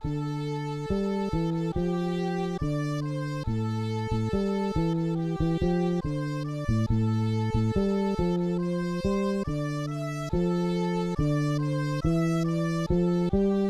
Music > Multiple instruments
A dramatic loop created using stringed instruments. It is at 140 BPM